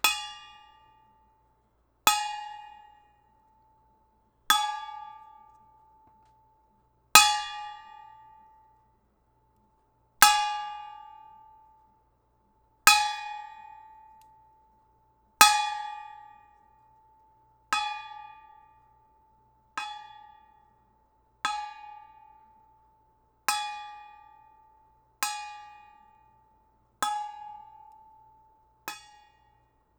Sound effects > Objects / House appliances
METLImpt-Blue Snowball Microphone, CU Wellness Tumbler Nicholas Judy TDC
Metal impacts on a Wellness tumbler.
impact, Blue-Snowball